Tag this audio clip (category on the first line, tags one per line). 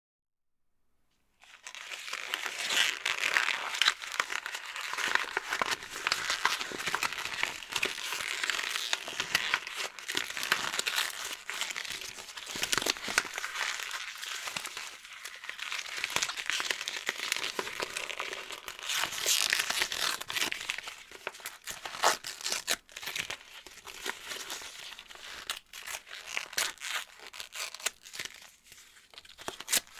Sound effects > Objects / House appliances
cardboard
crush
tear
handling
crushing
handle
packaging
tearing
sfx